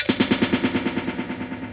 Sound effects > Electronic / Design
Impact Percs with Bass and fx-043
sfx, combination, deep, mulit, bash, looming, ominous, brooding, explosion, oneshot, perc, foreboding, theatrical, smash, crunch, impact, hit, low, cinamatic, fx, percussion, explode, bass